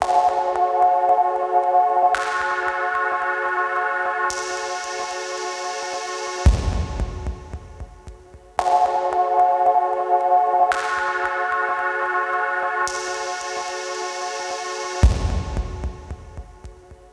Music > Solo instrument
112 A CasioSK1Texture 01
Loop, Analog, 80s, Brute, Vintage, Electronic, Synth, Soviet, Texture, Polivoks, Casio, Melody, Analogue